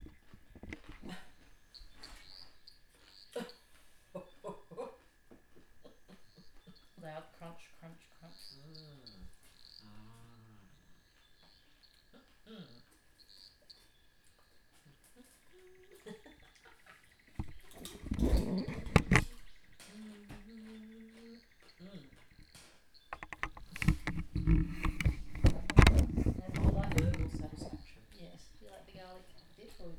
Soundscapes > Indoors
ambient sounds of a quiet evening visiting friends in the forest
ambient, chittychat, mic, rumbles